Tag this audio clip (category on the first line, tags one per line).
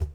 Sound effects > Objects / House appliances
pour
clang
bucket
cleaning
tool
metal
container
knock
fill
shake
tip
drop
plastic
water
clatter
pail
hollow
scoop
household
handle
foley
liquid
object
kitchen
lid
garden
carry
spill
slam
debris